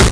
Sound effects > Natural elements and explosions
crustiest hit
heavily modified recording of me hitting my laptop microphone, which is already breaking btw lol
bang, crust, crustiest, crusty, etheruaudio, explosion, hit, idk, impact